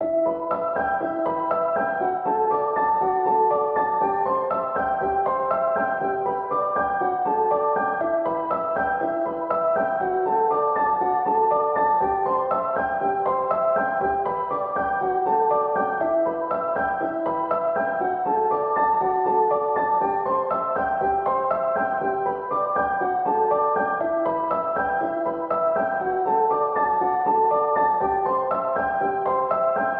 Music > Solo instrument
Piano loops 170 efect 4 octave long loop 120 bpm
120, 120bpm, music, piano, samples